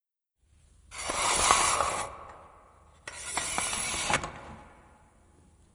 Sound effects > Human sounds and actions
Curtain/Slide
Slide, Pull, Drag, Curtian